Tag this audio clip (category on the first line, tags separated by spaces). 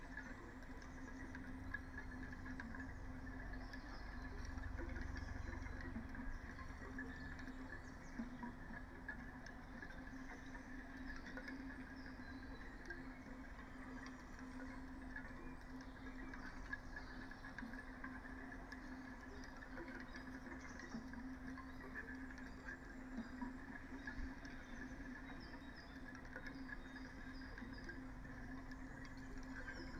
Soundscapes > Nature
phenological-recording natural-soundscape data-to-sound raspberry-pi artistic-intervention alice-holt-forest sound-installation field-recording soundscape nature weather-data modified-soundscape Dendrophone